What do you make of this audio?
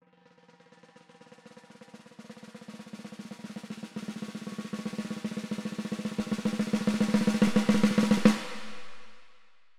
Music > Solo percussion
snare Processed - slow roll - 14 by 6.5 inch Brass Ludwig
drums, hit, beat, realdrums, processed, fx